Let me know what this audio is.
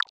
Instrument samples > Percussion
Organic-Water Snap 1
Botanical, Snap, Organic, EDM, Glitch